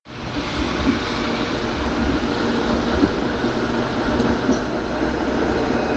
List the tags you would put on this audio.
Sound effects > Vehicles
urban
transport
approaching
tram

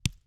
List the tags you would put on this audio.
Sound effects > Experimental
vegetable
thud
punch
foley
bones
onion

